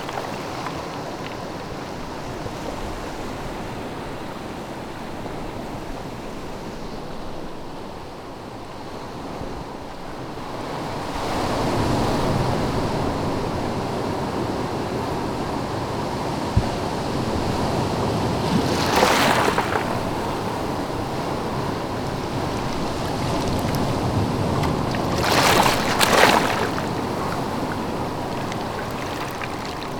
Soundscapes > Nature
Beach CloseUp XY MN
Tascam dr-100mkiii Rhode mono mic